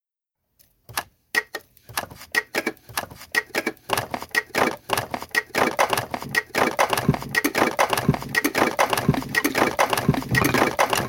Sound effects > Other
Horror Sound
Movie, Free, Rattle, Nightmare, Scary